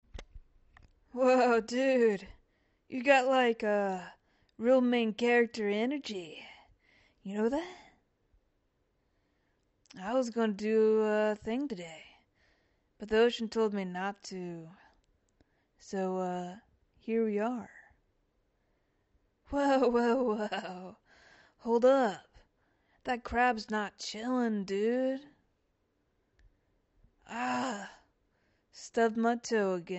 Speech > Solo speech
Character Voice Pack: “Hey Dude” Surfer Bro (chill / beachy / mildly confused by urgency)
A relaxed, goofy surfer voice pack perfect for games, animations, or audio comedy. Full of vibes, low on urgency. 1. Greeting / Introduction “Whoa—hey dude. You got, like, real main character energy, you know that?” 2. Idle / Casual Line “I was gonna do a thing today… but the ocean told me not to. So. Here we are.” 3. Alert / Warning “Whoa-whoa-whoa—hold up! That crab is not chillin’, dude!” 4. Hurt / Pain Reaction “Ahhh, oof! Stubbed my toe on... on vibes, man.” 5. Victory / Celebration “Crushed it, bro! Like, metaphorically. No actual crushing. Stay peaceful.” 6. Sarcastic / Confused “You wanna run into the haunted cave? Before snacks? …Bold.”
Character, chilln, dudecharacter, surfervoice, Voice, voiceover